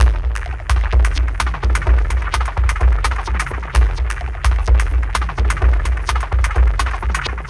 Music > Solo percussion
128 CR5000 Loop 03
128bpm, 80s, Analog, AnalogDrum, Beat, CompuRhythm, CR5000, Drum, DrumMachine, Drums, Electronic, Loop, music, Roland, Synth, Vintage